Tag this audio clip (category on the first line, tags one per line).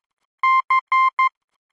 Sound effects > Electronic / Design
Telegragh
Morse
Language